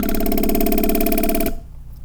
Sound effects > Objects / House appliances
knife and metal beam vibrations clicks dings and sfx-086
Clang, Metal, SFX, Vibrate